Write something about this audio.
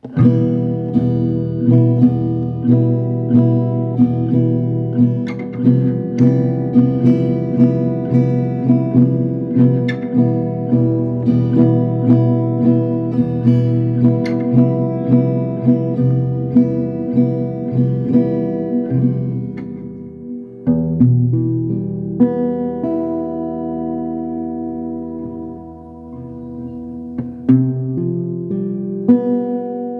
Music > Solo instrument
Guitar Two
String, Live, Free, Nylon, Guitar, Acoustic